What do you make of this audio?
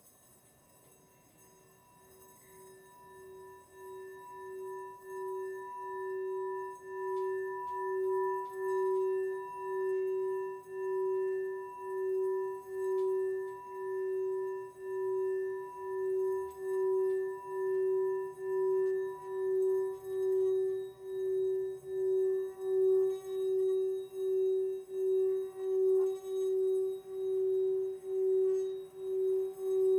Sound effects > Objects / House appliances
tibetan bowl / cuenco tibetano
cuenco tibetano, grabado con rode nt5 y sounddevices mixpre6 ------------------------------------------------------------------------------------------------------------- tibetan bowl, recorded with rode nt5 and sounddevices mixpre6
cuenco-tibetano, tibetan, cuenco, meditation, frecuencias, drone, tibetan-bowl, bowl